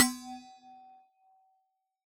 Sound effects > Objects / House appliances
Resonant coffee thermos-006
percusive, recording, sampling